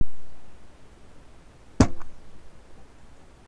Instrument samples > Percussion
Bass drum imitation
Making a bass drum sound with a metal pot with lid.
Isolated, Kick, Percussion